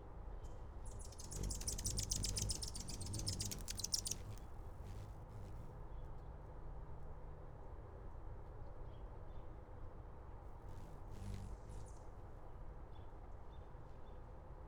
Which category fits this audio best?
Soundscapes > Nature